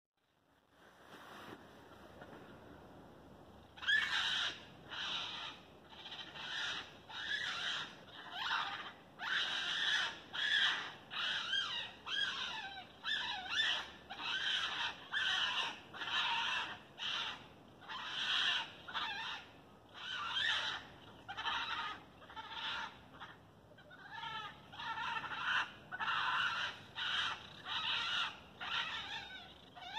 Sound effects > Animals
An angry raccoon or two in the woods at night near my home in Kentucky, at the time. No provocation or abuse occurred, I promise. I just woke up and heard the little guys mad at each other outside.